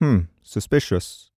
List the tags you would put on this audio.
Speech > Solo speech
FR-AV2 hesitant 2025 hmm MKE600 Generic-lines Male Voice-acting Adult mid-20s suspecious Sennheiser VA Calm Tascam Shotgun-mic Single-mic-mono MKE-600 Shotgun-microphone Hypercardioid july

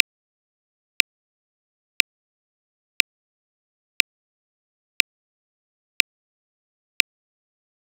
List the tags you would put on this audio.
Sound effects > Electronic / Design
Clock Time

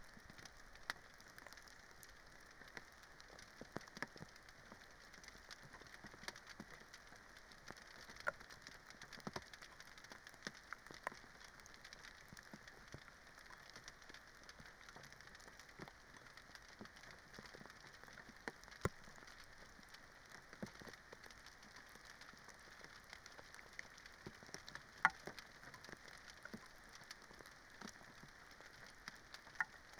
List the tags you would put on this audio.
Soundscapes > Nature
raspberry-pi soundscape nature meadow field-recording

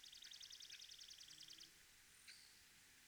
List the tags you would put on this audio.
Soundscapes > Nature
bird birdsong field-recording marsh nature swamp wetland wetlands

